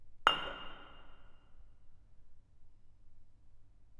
Sound effects > Objects / House appliances
Glass bottle set down on marble floor 2
A glass bottle being set down on a marble floor (in an apartment building stairwell). Recorded with a Zoom H1.
Bottle, Floor, Glass, Hit, Impact, Marble, Wine